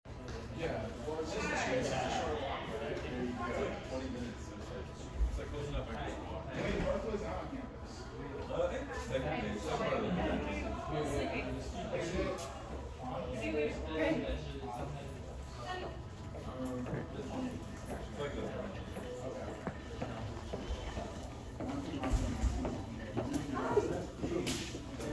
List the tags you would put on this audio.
Soundscapes > Indoors
indoor
soundscape
speech

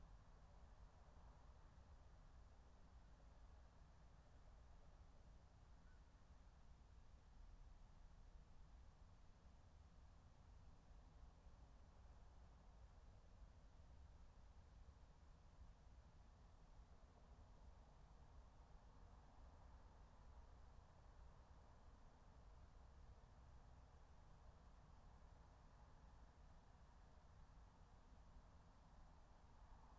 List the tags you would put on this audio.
Soundscapes > Nature
raspberry-pi
natural-soundscape
soundscape
phenological-recording
field-recording
nature
alice-holt-forest
meadow

